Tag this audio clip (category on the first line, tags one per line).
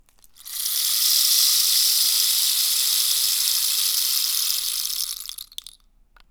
Sound effects > Objects / House appliances
bonk
clunk
drill
foundobject
glass
hit
industrial
metal
object
percussion
sfx